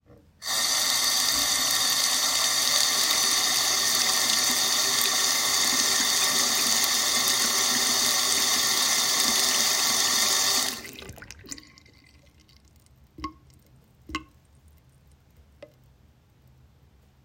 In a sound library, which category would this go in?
Sound effects > Objects / House appliances